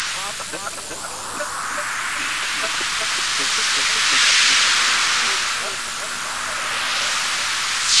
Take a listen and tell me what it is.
Sound effects > Electronic / Design
SFX Radiowavestatic
Shortwave static crackle with grainy analog breakup.
noise, Shortwave, sfx, lo-fi, crackle, analog, static, glitch, grainy